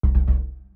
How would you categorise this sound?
Instrument samples > Synths / Electronic